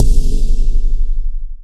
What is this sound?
Instrument samples > Percussion
erroneous deepcrash 1
THIS IS A CRASH TIMBRE, NOT A FINAL FILE!!! An erroneous deepcrash for crashbuilding. The high frequencies are too strong, and the low frequencies are too weak.
Sabian, 2-kHz, Zildjian, metallic, Meinl, spock, cymbal, clash, China, smash, splash, clang, crashbuilding, Zultan, Soultone, timbre, trigger, Stagg, drumbuilding, metal, high-pass, crash, soundbuilding, 2kHz, crunch, Paiste, sinocymbal, sinocrash